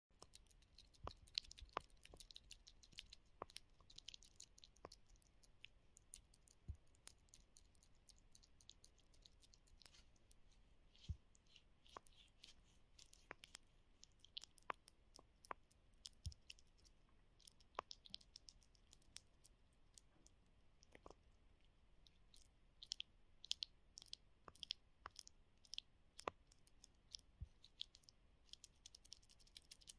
Sound effects > Human sounds and actions
Female masturbating ( wet no vocal )
cum, female, masturbating, orgasm, sexual, woman